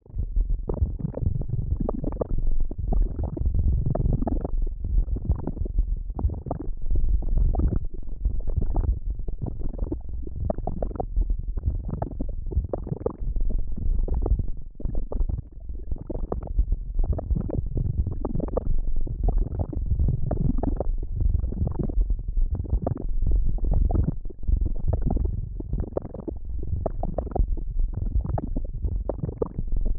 Sound effects > Electronic / Design
ROS-Random Granular Texture 2
I was dragged a drumloop into phaseplant granular. Processed with KHS Filter Table, Vocodex, ZL EQ and Fruity Limiter. Sample used from: TOUCH-LOOPS-VINTAGE-DRUM-KIT-BANDLAB
Organic, Granular